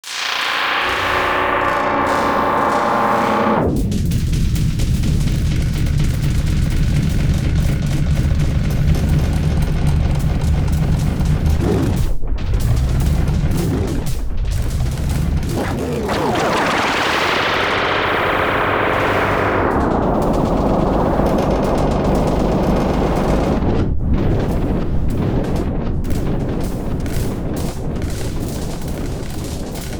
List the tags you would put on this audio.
Sound effects > Experimental
alien animal delay experiment experimental FX growling laser modulation reverb sci-fi space wind

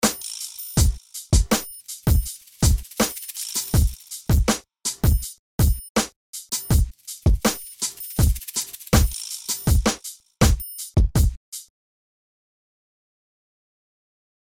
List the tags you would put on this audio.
Music > Multiple instruments
130-bpm 80bp bucle container drum hihat Hip-hop improvised percs percussion-loop percussive Rap rubbish

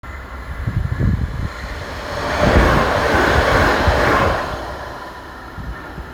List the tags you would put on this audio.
Soundscapes > Urban
field-recording Tram

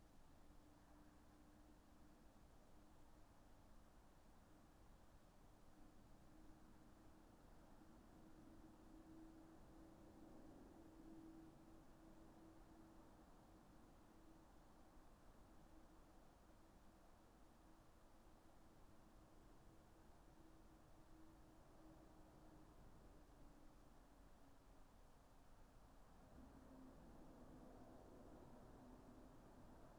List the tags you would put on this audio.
Soundscapes > Nature
Dendrophone field-recording sound-installation natural-soundscape alice-holt-forest data-to-sound soundscape phenological-recording nature artistic-intervention weather-data modified-soundscape raspberry-pi

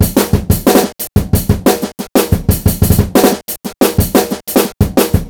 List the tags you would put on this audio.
Music > Other
break breakbeat drumloop drums groovy loop percs percussion-loop quantized

